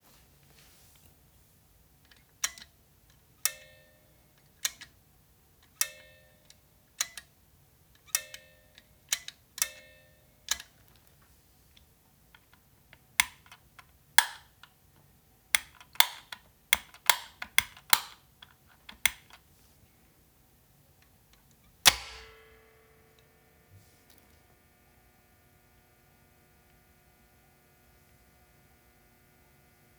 Sound effects > Other mechanisms, engines, machines
A Siemens SXL-EO 90 A 600 VAC contactor opening and closing. These are intended for use with reasonably powerful motors, and are designed to work in concert with an overload relay that detects if the internal temperature of the motor has reached dangerous levels. The overload relay has manual trip and close buttons, which is what I'm using to control the contactor when recording this sound effect. First 9 clicks are manually opening and closing the overload relay on the contactor. Next 9 clicks are from opening/closing another switch. The very loud thunk noises that follow are actually switching the contactor on and off. Recorded using the microphone of an iPhone 11 with no further processing.